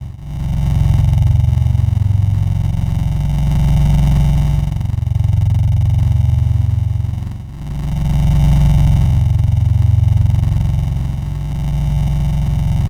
Sound effects > Other mechanisms, engines, machines
IDM Atmosphare13 (F note )
Synthetic, Industry, Working, IDM, Machinery, Noise